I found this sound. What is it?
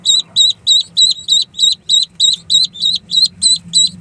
Sound effects > Animals
Waterfowl - Ducklings, Take 2
Recorded with an LG Stylus 2022, these are the sounds of domestic ducklings.
duck, barnyard, duckling